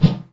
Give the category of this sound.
Sound effects > Objects / House appliances